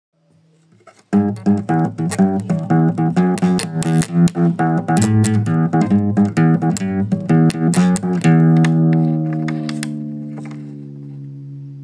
Music > Solo instrument
Serious.guitar
This is a recording of me playing my guitar. I played a little track i made up in my head.